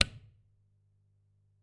Sound effects > Objects / House appliances
Click of the Amplifier Switch to Turn it Off
This is the sound of a guitar amplifier switch when you turn it off. The amplifier is the Polytone, a famous jazz amp for guitar. Recorded with Tascam Portacapture X6
click, short, button, polytone, turn-on, amplifier, switch